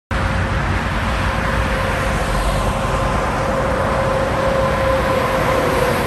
Sound effects > Vehicles
Sun Dec 21 2025 2
car, highway, road